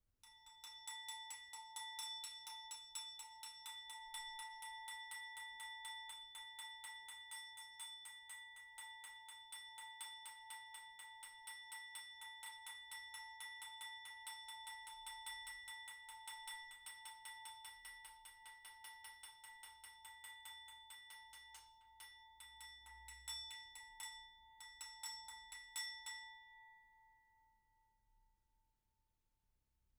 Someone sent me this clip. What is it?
Sound effects > Other
Glass applause 5
FR-AV2, Rode, clinging, applause, Tascam, indoor, wine-glass, individual, single, stemware, person, cling, solo-crowd, glass, NT5, XY